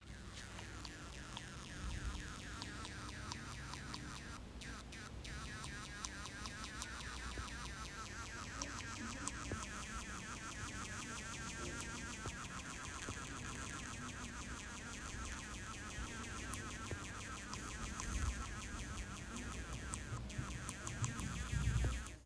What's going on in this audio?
Sound effects > Human sounds and actions
Wet Static Noise
A weird sound, made by water in the mouth. To me it sounds very electronic, like some kind of strange static or electrical interference.
Water, Static, Mouth